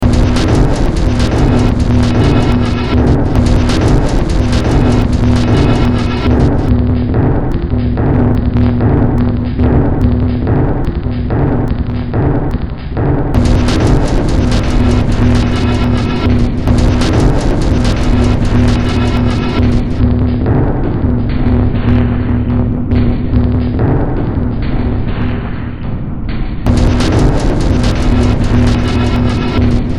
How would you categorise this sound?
Music > Multiple instruments